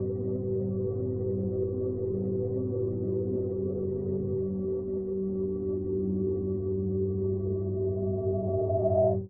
Soundscapes > Synthetic / Artificial
lavtor 1 drone 1lovewav
ambient, artificial, drone, experimental, sfx, space